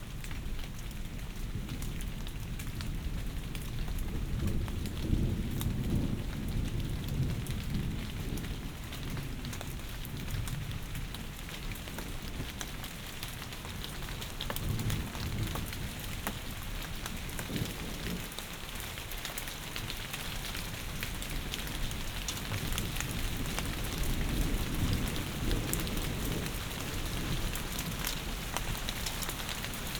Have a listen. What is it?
Soundscapes > Nature
Rain&rollingThunder thick-drops
Thick raindrops under leaves, getting more dense. In the background continuous rolling thunder. Recorded in the Cevennes.
Rain, Thunder